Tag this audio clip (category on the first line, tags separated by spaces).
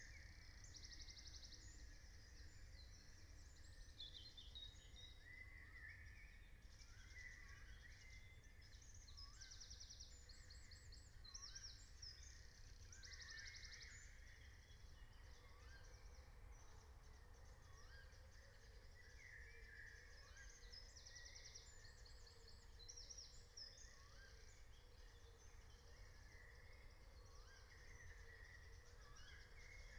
Soundscapes > Nature
field-recording soundscape phenological-recording nature alice-holt-forest meadow natural-soundscape raspberry-pi